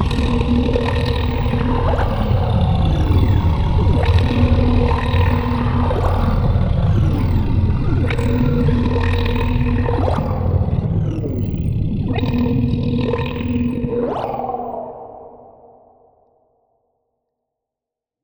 Sound effects > Experimental
Creature Monster Alien Vocal FX-21
Frightening, devil, Fantasy, Vox